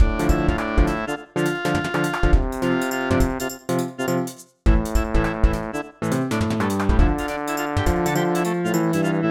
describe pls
Music > Multiple instruments
Groovy loop
Yet another loop made in beepbox. I don't actually know what you would use this for